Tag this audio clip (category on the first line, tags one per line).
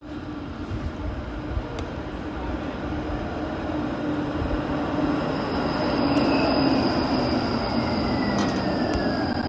Sound effects > Vehicles
field-recording
Tampere
tram